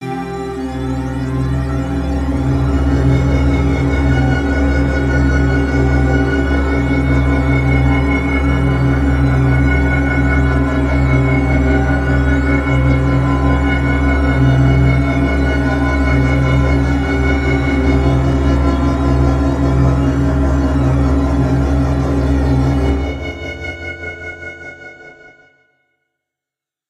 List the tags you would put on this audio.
Instrument samples > Synths / Electronic
pad space-pad C4 ambient synth one-shot cinematic

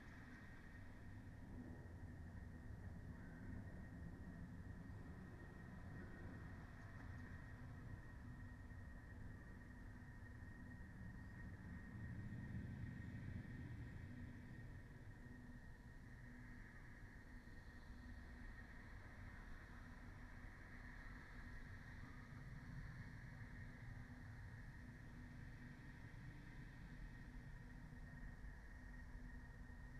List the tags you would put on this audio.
Soundscapes > Nature
data-to-sound modified-soundscape alice-holt-forest natural-soundscape nature Dendrophone phenological-recording sound-installation field-recording weather-data raspberry-pi soundscape artistic-intervention

